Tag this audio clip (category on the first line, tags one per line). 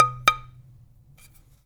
Music > Solo instrument
wood
rustle
keys
block
percussion
notes
foley
marimba
fx
tink
loose
woodblock
perc
oneshotes
thud